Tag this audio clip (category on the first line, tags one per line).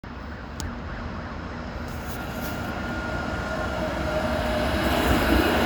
Soundscapes > Urban
city
tram
accelerating